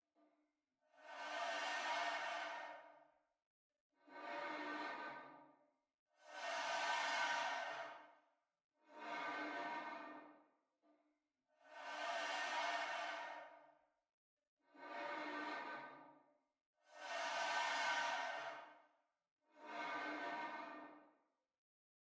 Sound effects > Human sounds and actions
Breathing through long and narrow tube. Some delay and modulation added.